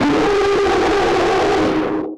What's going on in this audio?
Sound effects > Human sounds and actions
Horror Scream 2
Abyssal Monster Roar - Ultra Low-Pitch Horror Created entirely in Audacity. Layered deep growls, sub-bass rumbles, and warped creature moans, then pitch-shifted down to infrasound territory (20-40Hz) for that stomach-churning dread. Heavy distortion, long underwater reverb, slow echo trails, and bubbling water textures make it feel like a colossal deep-sea horror awakening from the black depths. The result: a creeping, otherworldly monster call that builds unease—perfect for eldritch abyssal creatures, SCP containment breaches, Lovecraftian sea gods, horror game bosses, or submarine tension scenes. Effects chain: Paulstretch for time-warping, Leveller + distortion for grit, Reverb (large hall/oceanic), massive low-end EQ boost. Duration: 15s (seamless loop).
creature, creepy, growl, growls, horror, monster, noises, scary, scream